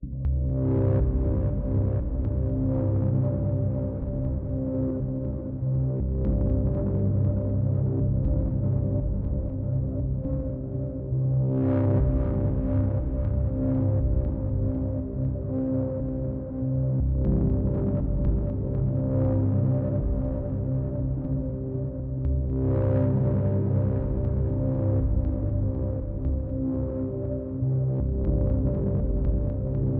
Music > Multiple instruments
Tried to create a background tension music for the first time, it can be used as a loop in some styles of games for tension & suspense during stealth or non-active combat. it's mostly created within pigments and processed through studio one 7 native plugins. I'd be happy to take any Feedback because it's my first time creating something in this style.